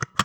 Sound effects > Objects / House appliances
Heavy Button
Button, Deep, Lever, Heavy